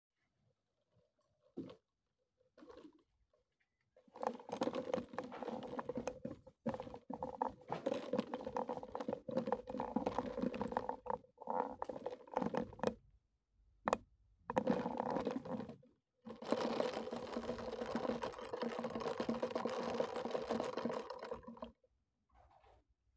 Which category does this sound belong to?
Soundscapes > Indoors